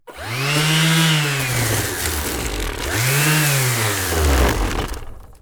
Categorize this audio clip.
Sound effects > Other mechanisms, engines, machines